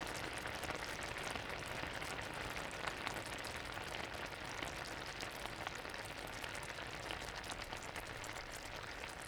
Sound effects > Objects / House appliances
Kitchen cooking sound recorded in stereo. Boiling water and sizzling vegetables in the backround.